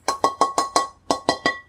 Sound effects > Objects / House appliances
Kỳ Duyên hit bowl in kitchen. Record use iPad 2 Mini, 2025.06.21 09:45